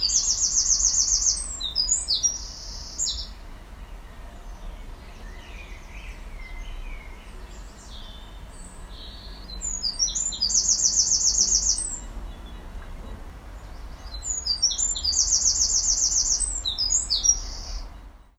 Soundscapes > Nature
The fantastic sound of a Common Blackbird (Turdus Merula) imitating an electronic alarm in West London, UK, as a distant European Robin (Erithacus Rubicula) responds. The faint sound of a house alarm and distant traffic can be heard in the background. Recorded on an iphone in 2020.